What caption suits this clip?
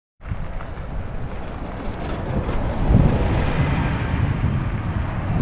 Sound effects > Vehicles
A bus passing by. The sound was recorded at Hervanta (Tampere, Finland). The sound was recorded using Google pixel 6a microphone. No extra gear was used and no editing was performed. The sound was recorded for further classification model development, with a goal to classify vehichles by sound.